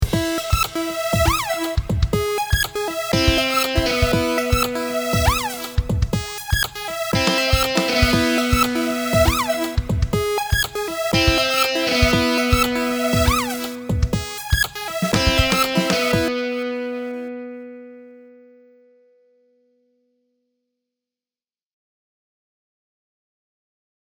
Music > Multiple instruments
Catchy Intro
This is a song I made in GarageBand.
Music, Melody, GarageBand